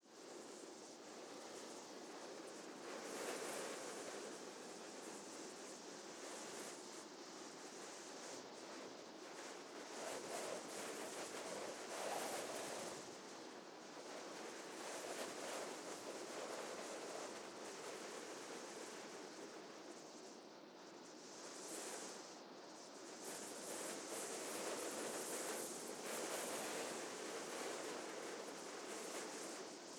Sound effects > Natural elements and explosions
gusts on plastic net 1
Gusts on plastic net. A plastic net is set in a appropriated place under a stong wind during low tide.
effect, field-recording, gale, gust, gusts, natural, net, plastic, storm, Villard, weather, wind, windy